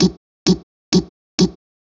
Speech > Solo speech
BrazilFunk Vocal Chop One-shot 11 130bpm
BrazilFunk, FX, One-shot, Vocal